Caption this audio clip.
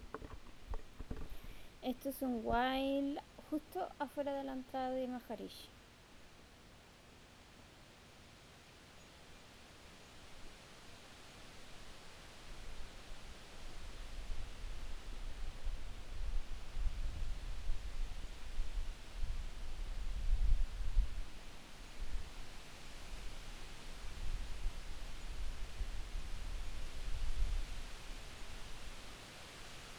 Soundscapes > Nature
Vlodrop-forest-ambience
This recording was made outside a wooden house in Vlodrop, in the Netherlands. It starts a bit windy, but there a many good trees dancing in the wind. Starting around minute 02:20, I come close to flowers and one can hear the bees coming and going.
forest, insects, trees, bees, nature